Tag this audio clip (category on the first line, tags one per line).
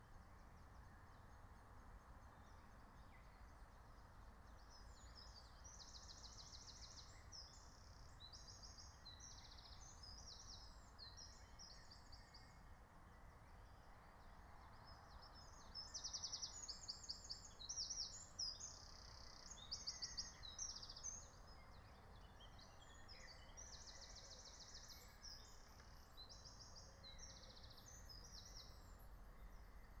Soundscapes > Nature
alice-holt-forest
phenological-recording
field-recording
meadow
soundscape
nature
natural-soundscape
raspberry-pi